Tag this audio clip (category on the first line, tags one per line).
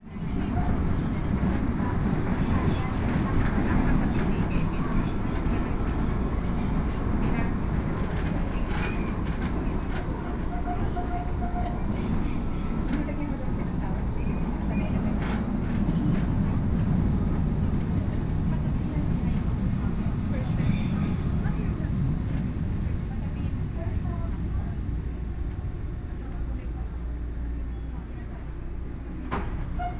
Soundscapes > Urban
train
women
voices
Manila
children
ambience
public-transportation
passengers
announcements
buzzer
men
Philippines
noisy
doors
kids
station
binaural
police-siren
people
soundscape
atmosphere
horn
platform
field-recording
noise
LRT